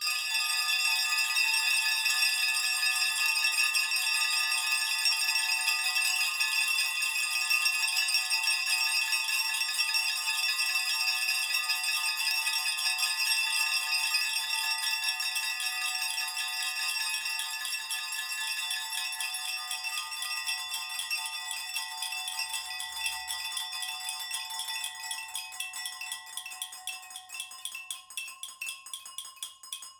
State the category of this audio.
Sound effects > Human sounds and actions